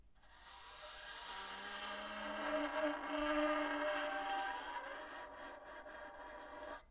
Instrument samples > String
Bowing broken violin string 6
beatup, broken, strings, creepy, bow, uncomfortable, violin